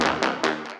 Instrument samples > Synths / Electronic
CVLT BASS 37
bass, wobble, low, subbass, lowend, stabs, clear, synth, drops, bassdrop, sub, lfo, wavetable, subwoofer, synthbass, subs